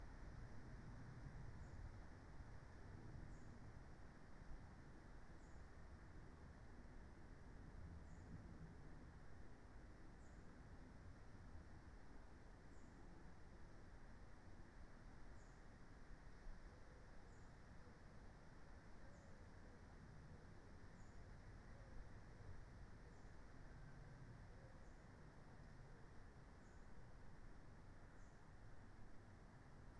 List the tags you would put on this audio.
Soundscapes > Nature
natural-soundscape
modified-soundscape
artistic-intervention
alice-holt-forest
phenological-recording
soundscape
data-to-sound
Dendrophone
sound-installation
raspberry-pi
nature
field-recording
weather-data

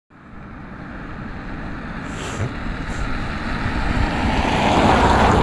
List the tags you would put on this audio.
Sound effects > Vehicles
car
traffic
vehicle

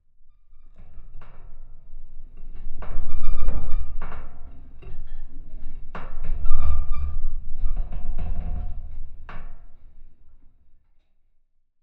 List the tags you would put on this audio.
Sound effects > Other
vibrating
shaking

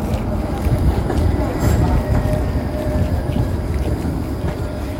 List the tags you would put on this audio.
Sound effects > Vehicles
city
tram
Tampere
traffic
field-recording

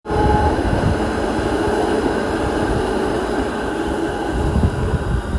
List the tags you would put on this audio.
Sound effects > Vehicles
Tampere
tram
vehicle

